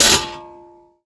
Sound effects > Objects / House appliances
shot-Bafflebanging-9
banging, impact, metal